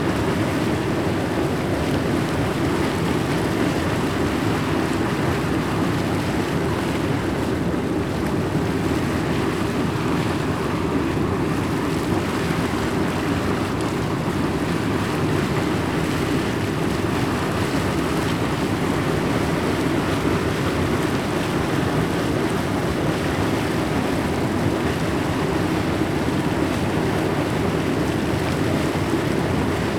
Soundscapes > Nature
Ambiance Hot Spring Furnas Caldeiras Loop Stereo 01
Hot Spring - Close/Medium Recording - Loop Recorded at Furnas (Caldeiras), São Miguel. Gear: Sony PCM D100.
bubblingwater, bubbling, hissing, caldeiras, environmental, azores, fieldrecording, vapor, thermal, furnas, saomiguel, nature, hotsteam, portugal, relaxation, boiling, hotspring, loop, water, natural, geothermal, stereo, soundscape, ambience, steam, outdoor